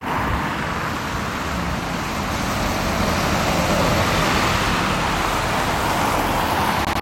Soundscapes > Urban
City bus engine and passenger transport vehicle. Low-frequency diesel engine rumble. Air-brake hiss during stopping, subtle vibrations from the chassis, tire noise rolling over asphalt. Occasional mechanical rattling and distant urban ambience such as traffic and wind. Recorded on iPhone 15 in Tampere. Recorded on iPhone 15 outdoors at a city bus stop on a busy urban street. Used for study project purposes.
bus, pubic, vehicle